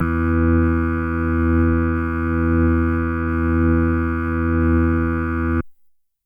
Instrument samples > Synths / Electronic
Synth organ patch created on a Kawai GMega synthesizer. E4 (MIDI 64)